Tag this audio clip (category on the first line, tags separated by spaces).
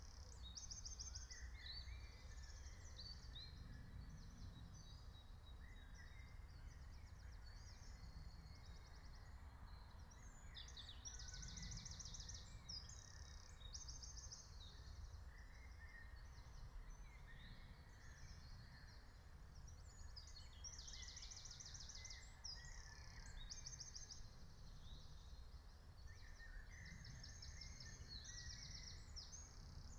Soundscapes > Nature
phenological-recording field-recording natural-soundscape soundscape alice-holt-forest nature meadow raspberry-pi